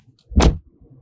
Sound effects > Other mechanisms, engines, machines
car-door-shutting
Car door slamming shut (recorded from the outside of the vehicle).
vehicle, sound-effects, field-recording, car